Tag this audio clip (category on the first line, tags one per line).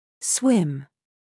Speech > Solo speech
voice
pronunciation